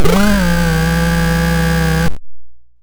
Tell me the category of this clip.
Sound effects > Electronic / Design